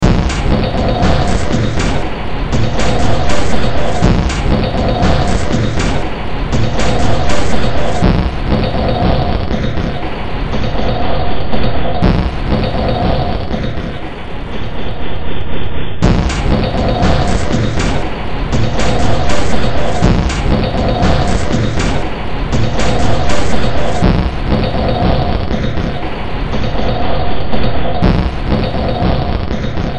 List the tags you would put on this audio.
Music > Multiple instruments
Ambient
Games
Horror
Industrial
Underground

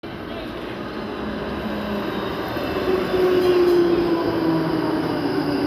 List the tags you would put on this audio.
Soundscapes > Urban
tram
tramway

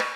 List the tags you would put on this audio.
Music > Solo percussion
beat
drumkit
processed
realdrum

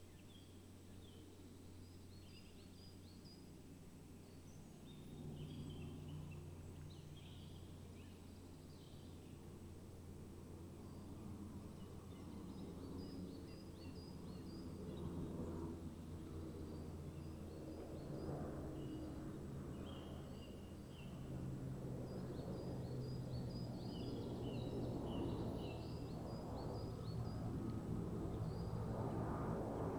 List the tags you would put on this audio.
Soundscapes > Nature
raspberry-pi
phenological-recording
natural-soundscape
field-recording
data-to-sound
alice-holt-forest
artistic-intervention
sound-installation
modified-soundscape
soundscape
nature
weather-data
Dendrophone

